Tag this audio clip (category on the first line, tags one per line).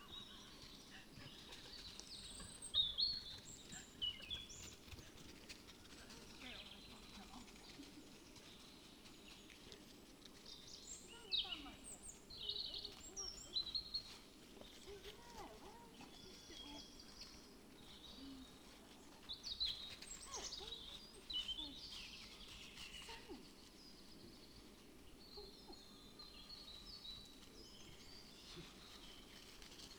Soundscapes > Nature
artistic-intervention
nature
Dendrophone
field-recording
alice-holt-forest
natural-soundscape
weather-data
soundscape
sound-installation
data-to-sound